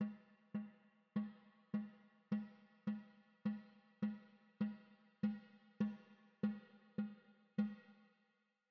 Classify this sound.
Music > Solo percussion